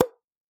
Sound effects > Objects / House appliances
Ribbon Trigger 6 Tone
A stretched satin ribbon, played like a string, recorded with a AKG C414 XLII microphone.
ribbon, tone, satin-ribbon